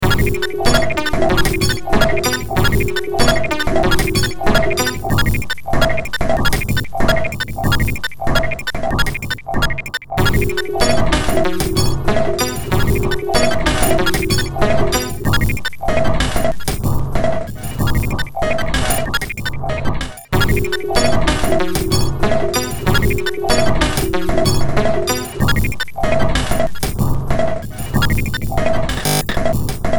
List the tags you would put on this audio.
Music > Multiple instruments
Noise
Horror
Industrial
Ambient
Sci-fi
Games
Underground
Cyberpunk
Soundtrack

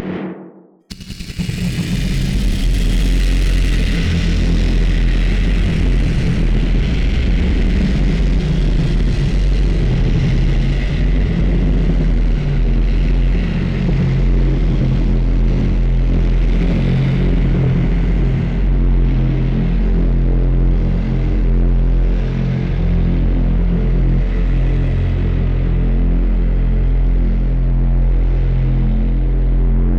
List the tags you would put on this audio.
Soundscapes > Synthetic / Artificial
ambience
bassy
dark
evolving
howl
sfx
shifting
slow
wind

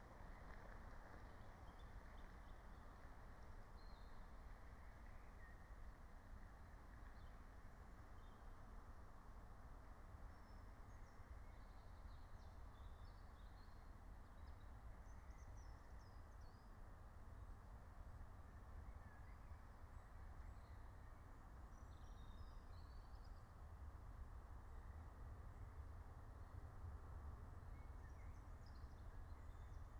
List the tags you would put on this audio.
Soundscapes > Nature
field-recording
meadow
nature
phenological-recording
raspberry-pi
soundscape

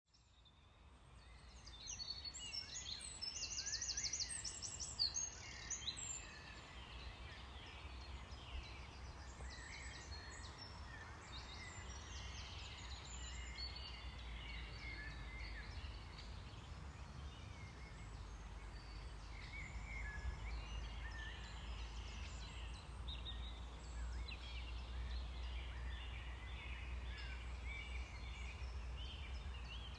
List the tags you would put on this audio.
Soundscapes > Nature
birds
birdsong
countryside
field-recording
nature
summer

